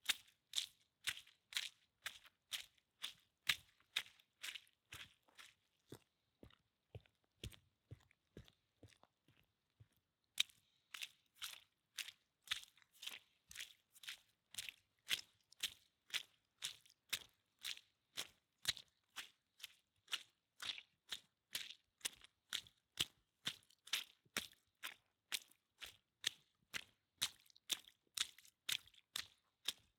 Sound effects > Human sounds and actions
Muddy Footsteps
While it was still raining, I went around walking in the mud and implementing a variety of different footstep sounds in the mud. I used the ZoomH5 Studio recording connected the Rode Video Mic Pro Plus. I did get some awkward stares from people passing by, because they were confused on what I was doing.
Grass, Foley, Footsteps, Walking, Rode, Field-Recording, Rain, WetFootseps, Muddy, Wet, RodeVideoMicProPlus, zoomH5Studio, Feet